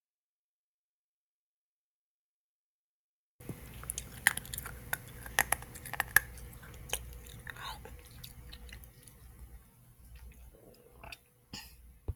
Sound effects > Human sounds and actions
Eating An Ice Cube Original
eating-ice-cube, eating-ice-cubes, eating